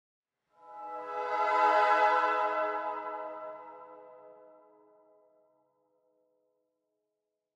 Music > Other
Mystical female choir swell 02
A mystical sounding choir swelling with lots of reverb. Made with real human voices in FL Studio, recorded a Shure SM57. After the swell of stacked vocals, there is a long trailing off. This one is higher pitched than the first version.
choir, choirs, choral, fantasy, female, human, magical, mystical, real, reverb, singing, stacked, swell, swelling, vocal, voice